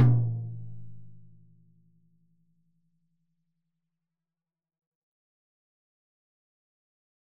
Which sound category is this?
Music > Solo percussion